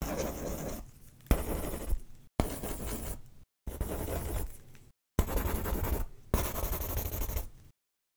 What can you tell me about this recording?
Sound effects > Objects / House appliances
Pencil scribble aggressively short
Pencil scribbles/draws/writes/strokes aggressively for a short amount of time.
draw, write